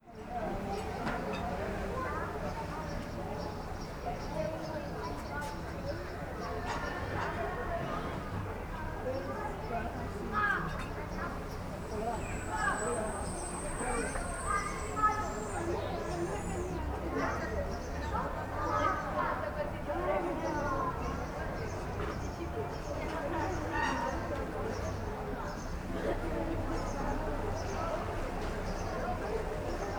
Soundscapes > Urban
003A 091112 0826 SP Resort swimming pool before dinner
Resort swimming-pool atmosphere before dinner. This recording has been made in a resort located in benalmadena Costa (Andalusia, Spain), at the end of a hot and sunny holiday afternoon. One can hear voices of adults and children enjoying their vacation, as well as sounds from the swimming-pool (water, splash) and from the terrace of the small restaurant located just near the pool. Some birds (sparrows, seagulls) can be heard in the background. Recorded in June 2025 with a Yamaha pocketrak c24. Fade in/out applied in Audacity. Please note that this audio file has been kindly recorded by Dominique LUCE, who is a photographer.